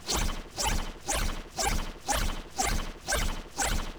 Sound effects > Electronic / Design
These samples were made by loading up samples from my FilthBot 125, Wood Chopping Techno and Broken Freezer packs into Soundmorph Evil Twin Reaktor ensemble. Expect muffy sci-noises and noisy 4/4 rhythms. This sample is a wet, whisky, pulsing rhythm.